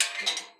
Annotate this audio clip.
Sound effects > Other
A recording of a Metal gate being pulled. Edited in RX 11.
creaking, gate, pitched, outdoor, metal